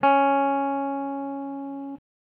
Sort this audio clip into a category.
Instrument samples > String